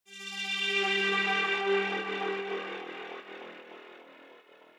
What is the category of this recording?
Sound effects > Electronic / Design